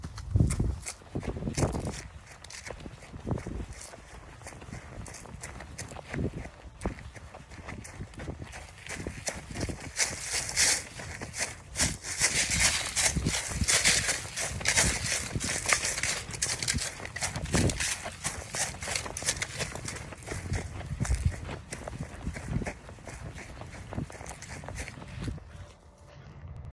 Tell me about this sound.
Soundscapes > Nature
Run in leaves some wind
I recorded this on my iPhone 17 Pro Max or me running through the leaves in my yard.